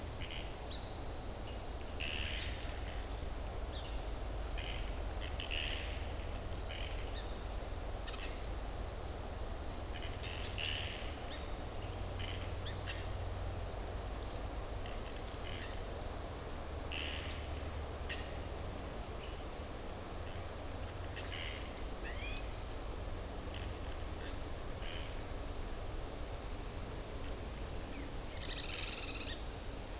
Soundscapes > Nature
Pender island birds in a forest near a dam and lake, airplane noise
Birds recorded in Pender island next to a lake, unfortunately lots of airplanes around
airplane, birds, forest, lake